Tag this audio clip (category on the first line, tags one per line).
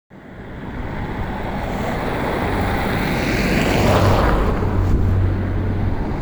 Sound effects > Vehicles
car
traffic
vehicle